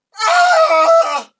Speech > Solo speech
Soldier-Death Agony scream
scream, agony, torment, pain